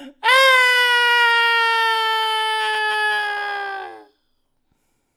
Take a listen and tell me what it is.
Sound effects > Human sounds and actions
puppet falling
falling, scream